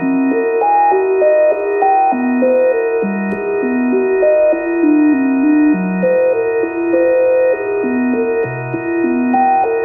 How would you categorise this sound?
Soundscapes > Synthetic / Artificial